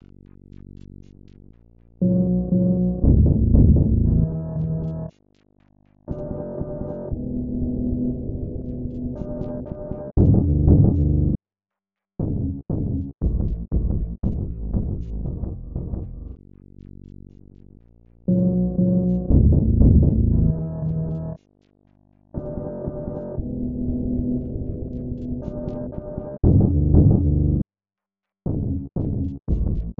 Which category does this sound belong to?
Instrument samples > Percussion